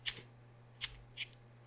Sound effects > Other
LIGHTER FLICK 16
lighter, flick, zippo